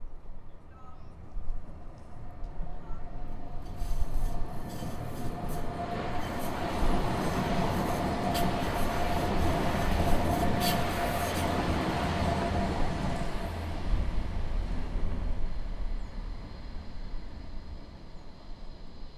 Sound effects > Vehicles
Tram screech 1
Tram screeching near Mariaplan, Gothenburg, recorded with a Zoom H5.
tram, streetcar, traffic, field-recording, street, city